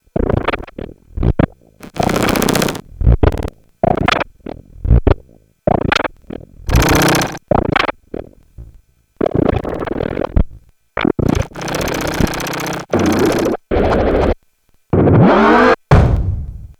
Sound effects > Experimental
This pack focuses on sound samples with synthesis-produced contents that seem to feature "human" voices in the noise. These sounds were arrived at "accidentally" (without any premeditated effort to emulate the human voice). This excerpt is based on using the output from touchplate controls (Ieaskul F. Mobenthey's "Mr. Grassi"), run through the "spectral array modualtion" mode of a MakeNoise Spectraphon module. I also utilized a stereo filter to create a more "rhythmic" variant on the same technique used for "Pareidolia 1" in this same series. The vocaloid samples here are less pronounced than on other samples in this pack, but still lurking...